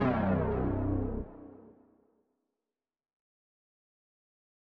Sound effects > Other
Hard Flop
Ultimate party killer. Made with FL Studio.
cinematic, dark, game, synth